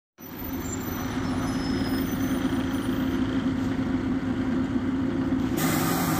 Sound effects > Vehicles
final bus 29
bus, finland, hervanta